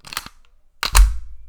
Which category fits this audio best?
Sound effects > Other